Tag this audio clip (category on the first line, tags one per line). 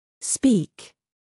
Speech > Solo speech
voice
word
pronunciation
english